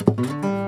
Music > Solo instrument
Acoustic Guitar Oneshot Slice 64
acoustic, chord, foley, fx, guitar, knock, note, notes, oneshot, pluck, plucked, sfx, string, strings, twang